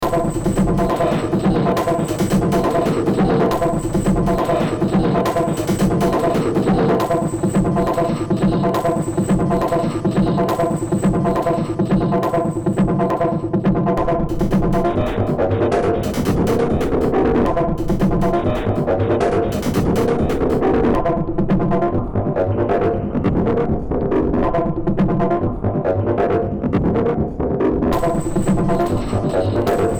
Music > Multiple instruments
Short Track #3934 (Industraumatic)
Cyberpunk, Horror, Ambient, Underground, Noise, Soundtrack, Sci-fi, Games, Industrial